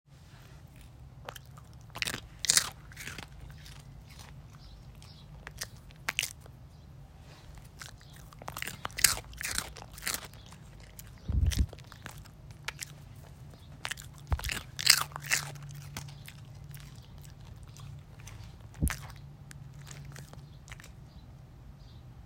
Sound effects > Animals
Dog Chewing Eating ASMR
Elderly dog (chihuahua-poodle/terrier mix) chewing some food, recorded very close up.
pet, animal, dog, eating, asmr, chewing